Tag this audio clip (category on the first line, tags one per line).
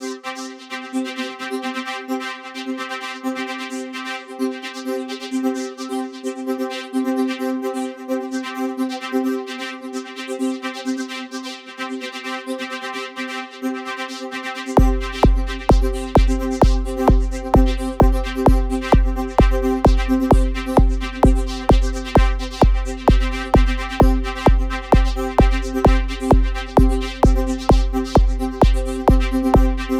Music > Multiple instruments
Dance
Electronic
Loopable